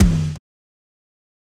Instrument samples > Percussion
Original recorded 8x6.5 Yamaha mounted rack tom one shot! Enjoy!